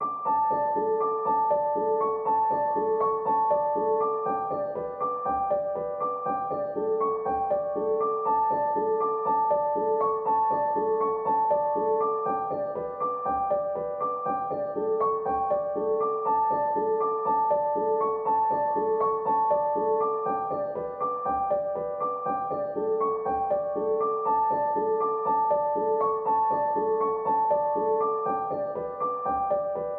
Music > Solo instrument
Piano loops 198 octave up long loop 120 bpm
120, 120bpm, free, loop, music, piano, pianomusic, reverb, samples, simple, simplesamples